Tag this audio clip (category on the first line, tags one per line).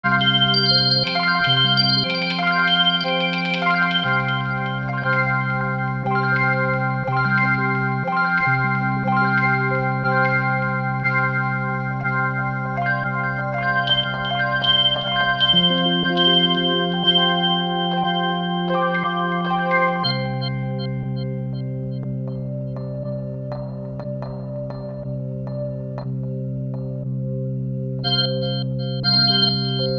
Soundscapes > Synthetic / Artificial
atmosphere
granular
jazz
brilliant
background
ambient
soundscape
dark
guitar
bright
texture